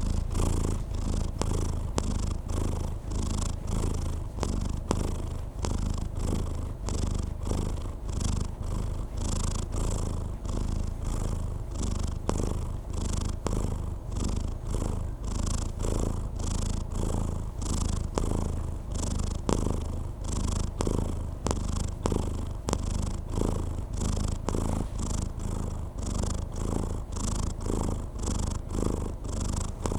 Sound effects > Animals
Pearl Purring
Subject : Pearl the cat, middle aged and a slightly chonky. Date YMD : 2025 November 21 Location : Albi 81000 Tarn Occitanie France. Hardware : Dji Mic 3 as mic and recorder. Weather : Processing : Trimmed and normalised in Audacity.
animal, cat, dji, feline, female, indoor, mic-3, mic3, middle-aged, pet, puring, purr, purring